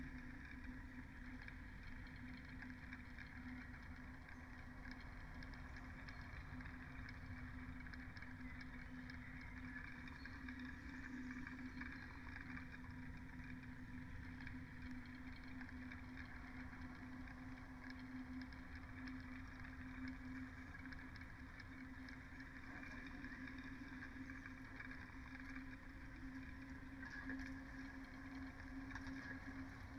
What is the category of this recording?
Soundscapes > Nature